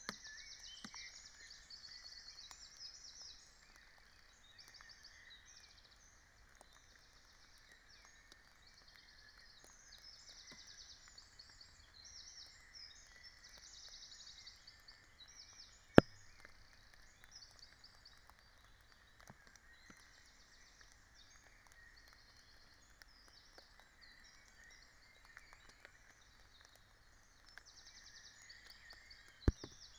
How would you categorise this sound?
Soundscapes > Nature